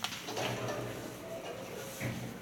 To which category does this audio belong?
Sound effects > Other mechanisms, engines, machines